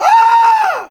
Sound effects > Human sounds and actions
Victim Screaming
A man (pretending to be) screaming in pain. "Has a 'wilhelm scream' quality to it".
it-hurts, aaaaaaah, getting-killed, wilhelm-like, murdered, agony, argh, aaah, impersonation, torture, shout, aah, willhelm-like, aaahhh, wilhelm-scream, man, voice, vocal, death, death-scream, aaaaaah, yell, scream, screaming, shouting, pain, yelling, male, tortured, willhelm-scream